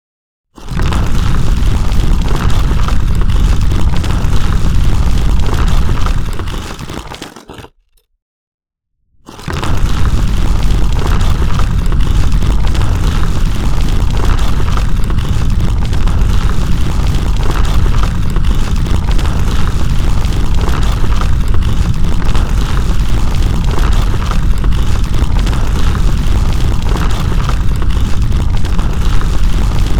Sound effects > Natural elements and explosions
rock avalanche w rumbling sound 01092026
rock falling avalanche with added rumble sound. can be used for superhero crashing, creatures digging in, or earth bending sounds.
avalanche, avatar, brick, collapse, collapsing, debris, deep, destruction, earth, earthquake, elemental, fall, falling, huge, movement, natural-disaster, nature, quake, rock, rubbish, rubble, rumble, rumbling, stone